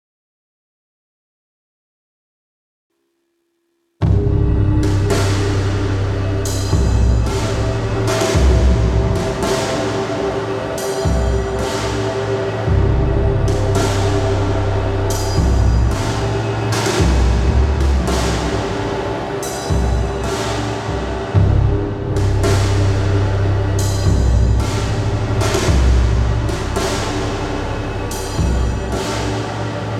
Music > Other
SWAMP DRUMS
Hello! It's great that you're here. How was your day? Did you have a GOOD LITTLE DAY?????????? I never cared. But anyway here's a bit of a "Drum Beat" or a loop type joint I got going for you. You can have it for free even! But you should give me money please :( cut me a little flow on the side, yknow? but it's fine though, you don't have to. All original music!